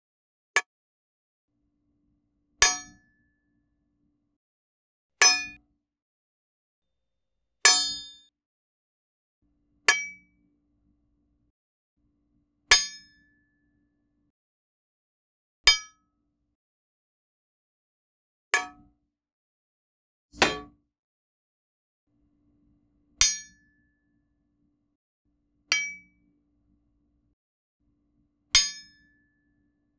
Sound effects > Objects / House appliances
yasomasa inspired fate sword hilt grabbing sound 08302025
ufotable inspired archer sword hilt grabbing sounds. Can also work for psais being grabbed.
anime, attack, battle, blade, combat, crowbar, duel, fate, fight, fighting, grab, grabbing, handle, hilt, karate, knight, kung-fu, martialarts, medieval, melee, metal, metallic, psai, sais, sword, swords, weapon, weapons, yasomasa